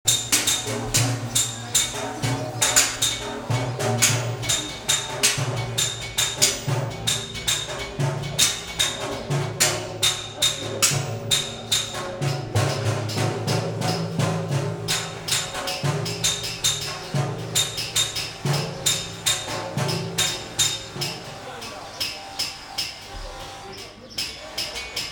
Soundscapes > Urban
Blacksmith Hammers Copper in Fes, Morocco